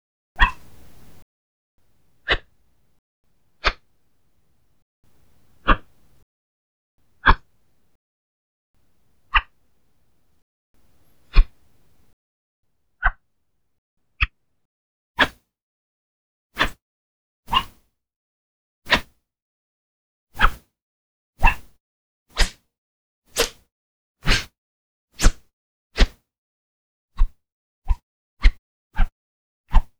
Sound effects > Objects / House appliances
custom leo light swipe sound final 07252025
sword swipe inspired by tmnt 2012. prop used from wooden backscratcher and or silverware fork.